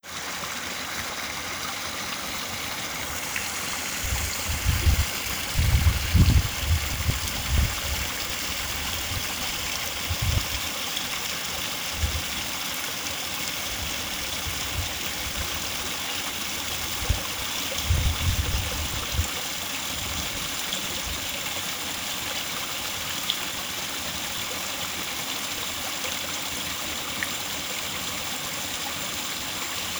Sound effects > Natural elements and explosions
From a small stream/fall in Hvalvik, on the Faroe Islands. Recorded with Samsung phone.